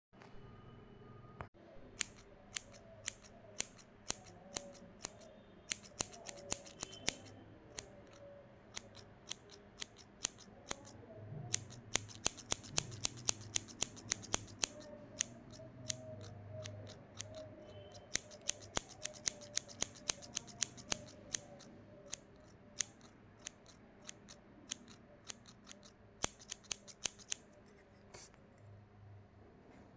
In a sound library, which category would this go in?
Soundscapes > Indoors